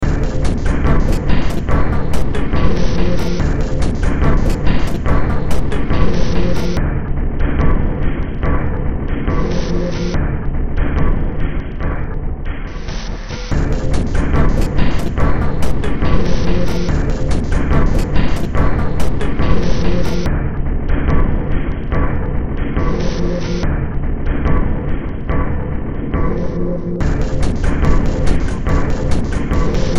Music > Multiple instruments
Short Track #3554 (Industraumatic)
Soundtrack, Games, Sci-fi, Noise, Industrial, Ambient, Underground, Horror, Cyberpunk